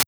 Instrument samples > Synths / Electronic
databent closed hihat 4
A databent closed hihat sound, altered using Notepad++
hihat, percussion